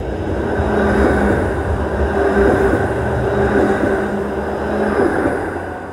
Sound effects > Vehicles
A recording of a tram passing by on Insinöörinkatu 41 in the Hervanta area of Tampere. It was collected on November 12th in the afternoon using iPhone 11. The weather was sunny and the ground was dry. The sound includes the whine of the electric motors and the rolling of wheels on the tracks.